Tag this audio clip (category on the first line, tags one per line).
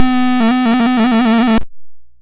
Sound effects > Electronic / Design
Robot
DIY
Theremin
Glitchy
FX